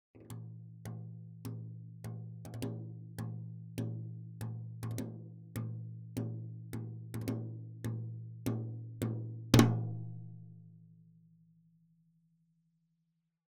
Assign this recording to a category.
Music > Solo percussion